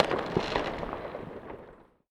Sound effects > Natural elements and explosions
Single Firework Shot - 01
Recorded on 01.01.2026
firecrackers, rocket, firework, explosion, fireworks